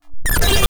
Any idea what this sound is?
Sound effects > Electronic / Design
Optical Theremin 6 Osc Destroyed-027
Theremin, Synth, Robot, Handmadeelectronic, Bass, Instrument, Theremins, Electro, Alien, Infiltrator, noisey, Glitch, Noise, Otherworldly, SFX, Robotic, Experimental, Electronic, Analog, Glitchy, Dub, Spacey, Optical, Trippy, FX, Digital, Scifi, Sweep, DIY, Sci-fi